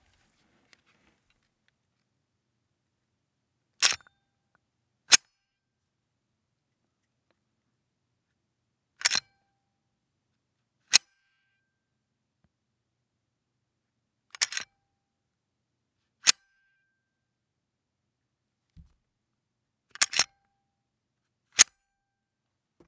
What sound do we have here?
Sound effects > Other mechanisms, engines, machines
Glock17 Slide.1
Racking and releasing a Glock 17's slide. GLOCK 17 SLIDE
Firearm, Gun, Pistol, Weapon